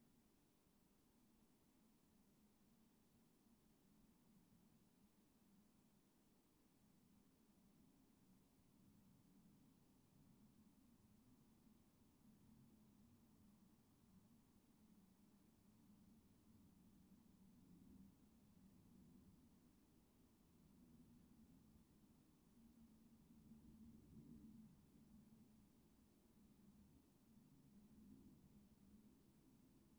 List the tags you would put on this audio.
Soundscapes > Nature
soundscape weather-data modified-soundscape natural-soundscape raspberry-pi

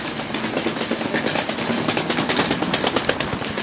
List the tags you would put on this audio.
Sound effects > Other mechanisms, engines, machines
grocery sidewalk drive paving-slabs mall

